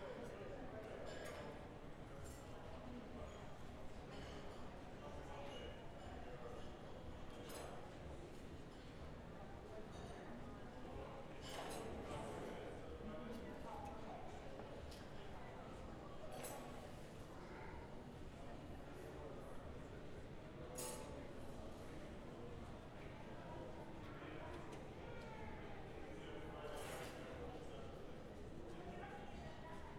Soundscapes > Indoors
AMBPubl Midday mall area at the airport, cafe closeby, Christchurch, New Zealand
Recorded 12:02 20/12/25 Sitting in a hall with various stores, one of which is a cafe where from you can hear dishes clattering. Plenty of people walking in the corridor since its noon. Two announcements for people to come to the gates. Zoom H5 recorder, track length cut otherwise unedited.